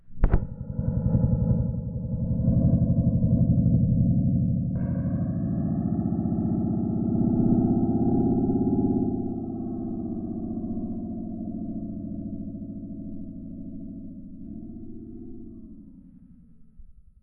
Sound effects > Experimental
shots-bendyEolioan02
Mangling and banging an Aeolian harp in the garden
aeolian, detuned, sustain